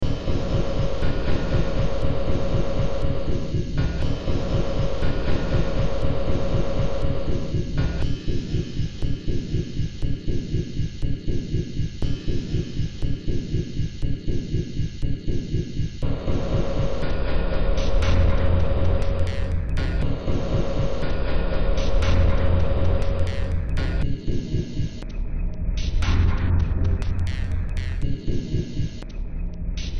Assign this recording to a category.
Music > Multiple instruments